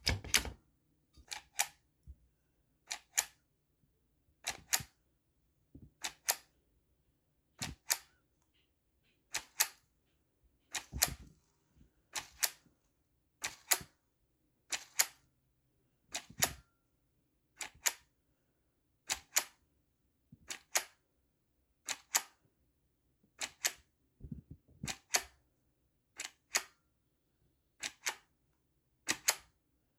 Sound effects > Objects / House appliances
OBJOffc-Samsung Galaxy Smartphone Three Hole Paper Punch, Punching Holes, No Paper Nicholas Judy TDC
A three hole paper punch punching holes without paper.
three-hole, holes, Phone-recording, punch, paper-punch, foley